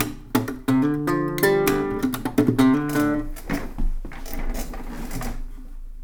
Music > Solo instrument
acoustic guitar slap 4
slap, acosutic, solo, guitar, strings, pretty, riff, string, twang, knock, instrument, dissonant, chord, chords